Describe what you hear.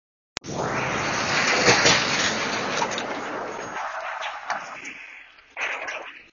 Soundscapes > Urban
Bus arriving 1 3
Where: Tampere Keskusta What: Sound of bus arriving at a bus stop Where: At a bus stop in the morning in a mildly windy weather Method: Iphone 15 pro max voice recorder Purpose: Binary classification of sounds in an audio clip
bus, bus-stop, traffic